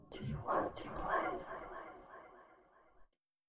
Speech > Processed / Synthetic
Recorded "Do you want to play" and distorted with different effects.
distorted, halloween, sfx